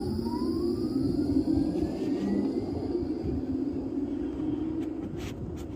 Soundscapes > Urban
final tram 23
hervanta, tram